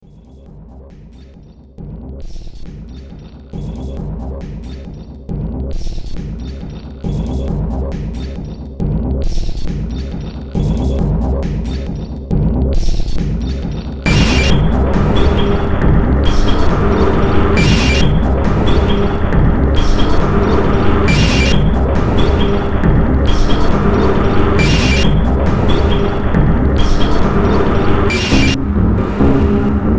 Music > Multiple instruments
Demo Track #3261 (Industraumatic)
Industrial, Sci-fi, Soundtrack, Ambient, Cyberpunk, Noise, Horror, Underground, Games